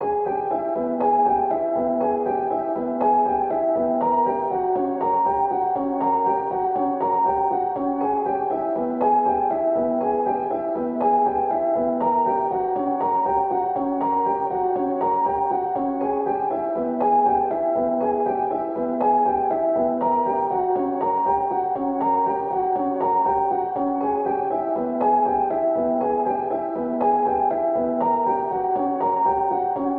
Music > Solo instrument
Piano loops 099 efect 4 octave long loop 120 bpm
pianomusic, music, simplesamples, 120bpm, piano, 120, samples, loop, reverb, simple, free